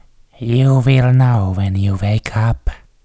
Speech > Solo speech
you will know when you wake up
calm, man, male, human